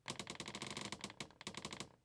Sound effects > Objects / House appliances
wooden door creak2
A series of creaking sounds from some old door recorded with I don't even know what anymore.
door, foley, old-door, creaking, creak, horror, wooden